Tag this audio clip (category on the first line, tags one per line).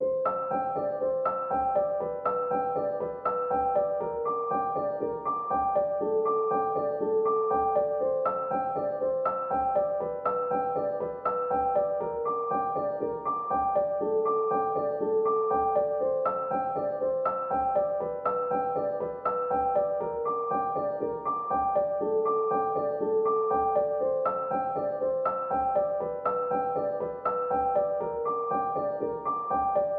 Music > Solo instrument
up
piano
combined
work
44
harmony
Elfman
Beautiful
can
by
Danny
sound
other
pack
bpm
120
Otherwise
inspired
This
sounds
well
usable